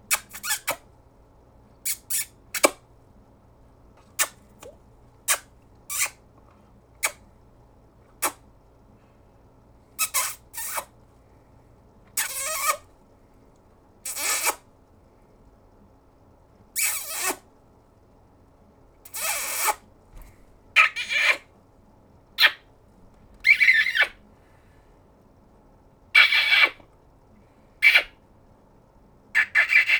Sound effects > Human sounds and actions
HMNKiss-Blue Snowball Microphone, CU Various Nicholas Judy TDC
A set of various kisses.
Blue-brand, Blue-Snowball, cartoon, kiss, various